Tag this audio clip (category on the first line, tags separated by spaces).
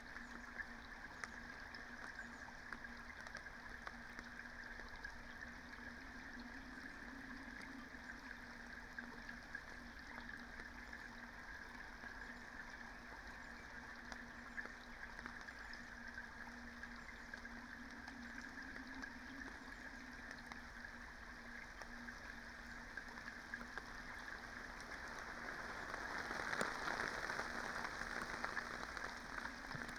Soundscapes > Nature
natural-soundscape phenological-recording modified-soundscape artistic-intervention raspberry-pi field-recording data-to-sound